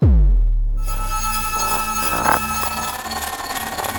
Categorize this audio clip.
Music > Multiple instruments